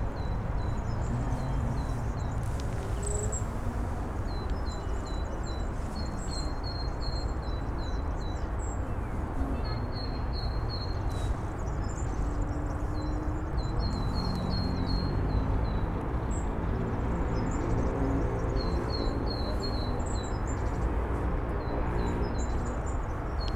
Soundscapes > Nature
Birds songs and highway noise of cars